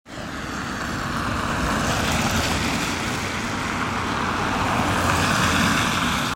Sound effects > Vehicles
Recording of a car near a roundabout in Hervanta, Tampere, Finland. Recorded with an iPhone 14
outside, automobile, vehicle, car